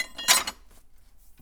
Sound effects > Other mechanisms, engines, machines
metal shop foley -065
knock, pop, percussion, perc, tink, sound, boom, strike, bop, sfx, foley, bam, shop, oneshot, bang, tools, thud, rustle, metal, fx, little, crackle, wood